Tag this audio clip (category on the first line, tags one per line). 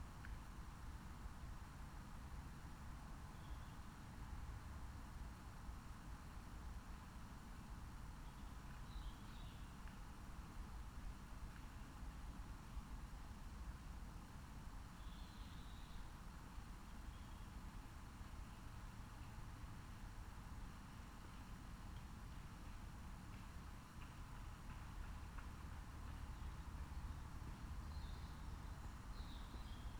Soundscapes > Nature
nature
soundscape
natural-soundscape
alice-holt-forest
meadow
raspberry-pi
phenological-recording
field-recording